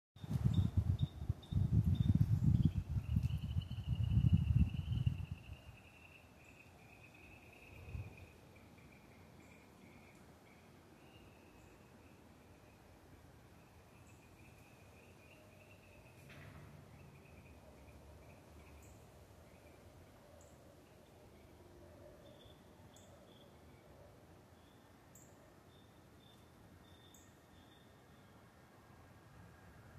Soundscapes > Nature
outdoor ambience (birds, wind, dogs)
soft backyard ambiance, birds chirping, wind sounds, random neighborhood sounds. recorded from a phone, from a backyard.
ambiance, backyard, birds, field-recording